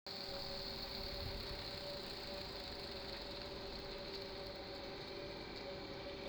Sound effects > Vehicles
tampere bus16
bus passing by near Tampere city center
vehicle, bus, transportation